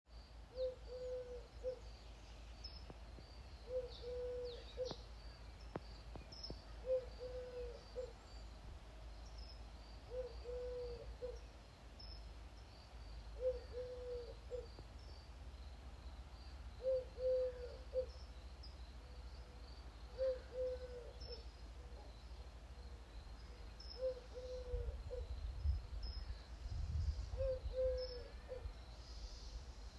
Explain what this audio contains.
Soundscapes > Nature
Morning ambience with dove 07/03/2022
Morning ambience with dove
countryside, dove, farmland